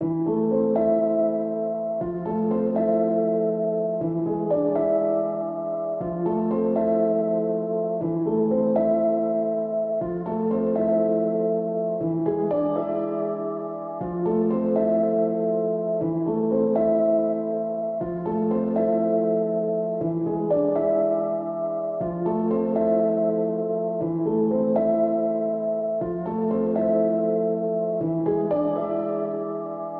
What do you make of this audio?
Music > Solo instrument
Piano loops 043 efect 4 octave long loop 120 bpm
120, reverb, music, simplesamples, loop, samples, pianomusic, piano, free, 120bpm, simple